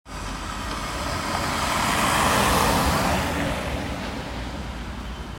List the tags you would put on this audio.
Sound effects > Vehicles
rain
vehicle

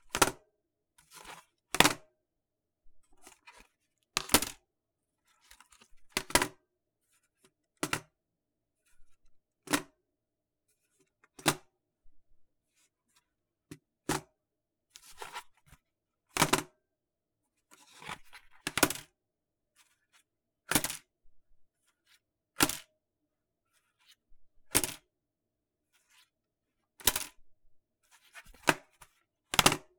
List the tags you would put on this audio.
Sound effects > Objects / House appliances
drop
thud

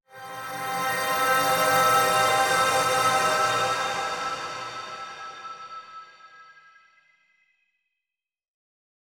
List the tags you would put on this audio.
Sound effects > Electronic / Design
reverse
magic
high-pitched
shimmering

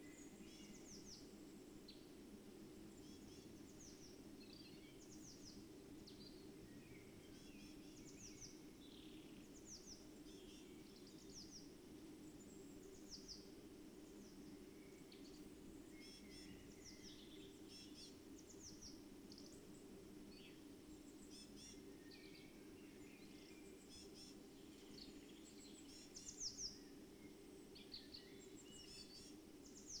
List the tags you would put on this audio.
Soundscapes > Nature
sound-installation
data-to-sound
alice-holt-forest
raspberry-pi
nature
natural-soundscape
modified-soundscape
soundscape
field-recording
weather-data
Dendrophone
phenological-recording
artistic-intervention

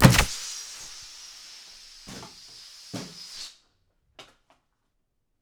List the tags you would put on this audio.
Sound effects > Objects / House appliances
Air
Balloon
deflating
flying
FR-AV2
NT45-o
NT5-o
NT5o
pshht
Rode
rubber
Tascam

